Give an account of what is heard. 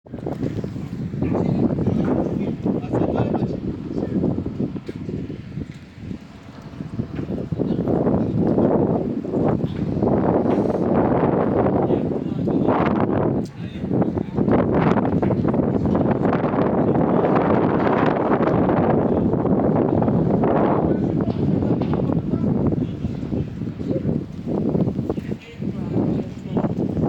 Soundscapes > Urban
7 févr., 11.00 port
harbour and boat and sea songs
boat, harbour, marina